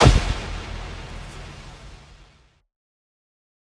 Sound effects > Electronic / Design
A custom cinematic impact designed from pure synthesis and resynthesis. Built for high-intensity transitions, trailers, and sound design work.